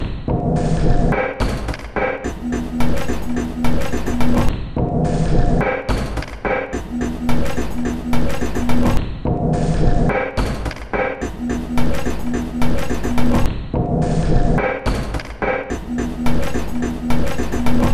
Instrument samples > Percussion
This 107bpm Drum Loop is good for composing Industrial/Electronic/Ambient songs or using as soundtrack to a sci-fi/suspense/horror indie game or short film.
Weird, Industrial, Samples, Packs, Soundtrack, Dark, Alien, Underground, Loop, Ambient, Drum, Loopable